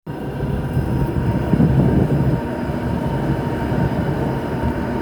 Soundscapes > Urban
voice 22-11-2025 1 tram
Tram, TramInTampere, Rattikka